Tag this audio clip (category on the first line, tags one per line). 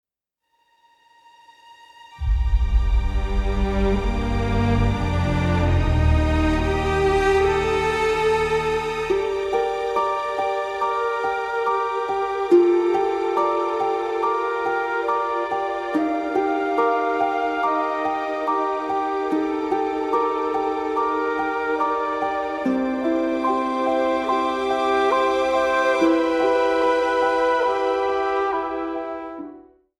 Music > Multiple instruments
gentle
ambient
film
peaceful
soft
guitar
calm
documentary
slow
flute
cinematic
orchestral
strings
movie
emotional
melancholic
inspiring
background
relaxing